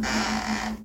Sound effects > Objects / House appliances
WOODFric-Samsung Galaxy Smartphone, CU Floorboard, Creak Nicholas Judy TDC
A floorboard creak.
floorboard, foley, Phone-recording, creak